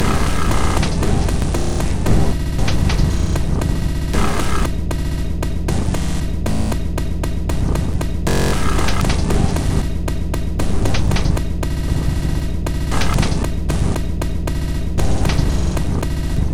Instrument samples > Percussion
This 116bpm Drum Loop is good for composing Industrial/Electronic/Ambient songs or using as soundtrack to a sci-fi/suspense/horror indie game or short film.
Drum, Samples, Weird, Underground, Loop, Loopable, Alien, Ambient, Packs, Soundtrack, Industrial, Dark